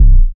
Instrument samples > Percussion
BrazilFunk Kick 29
Kick, Distorted, BrazilFunk, BrazilianFunk